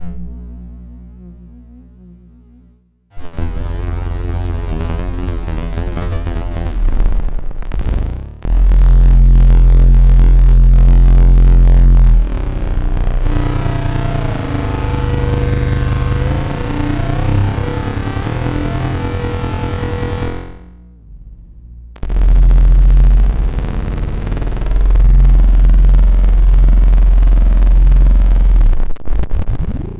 Sound effects > Experimental
Analog Optical Theremin Drones, Bass synth, and Glitch FX sequence (my home made synth)
a sequence of trippy fx made with my homemade optical theremin, it has 6 oscillators, and old playstation joytsticks to control voltage and the sounds are controlled on this recording with a headlamp, it is all run through the DL4 delay modeler, with no additional processing